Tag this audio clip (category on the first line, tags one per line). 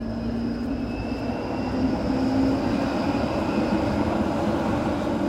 Sound effects > Vehicles
city
public-transport
tram